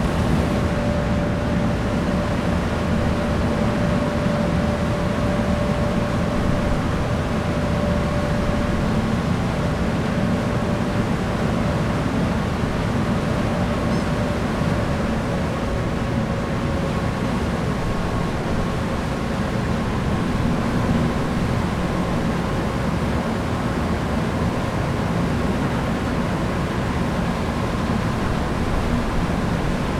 Soundscapes > Urban
AMBNaut-Summer Fort Morgan Ferry Stern Engines Afternoon QCF Gulf Shores Alabama Zoom H1n
Near the stern of the ferry between Dauphin Island and Fort Morgan, Alabama. Summer late afternoon, engines, seagulls, wind.
ferry, engine, ship, diesel, field-recording